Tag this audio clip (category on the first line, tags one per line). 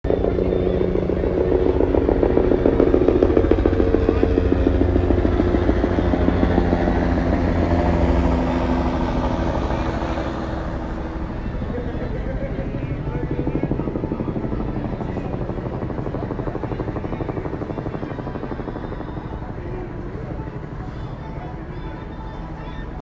Sound effects > Vehicles
aviation
flying
helicopter